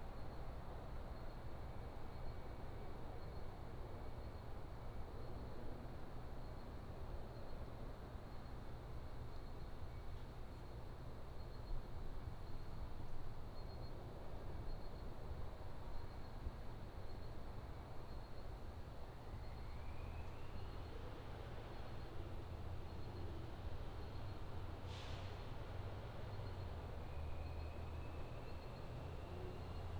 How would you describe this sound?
Soundscapes > Urban
Nighttime Crickets in Florida 2
A nighttime recording of crickets from an urban backyard in north Florida in December. Traffic can be heard low in the background.
crickets, florida, night, tallahassee, winter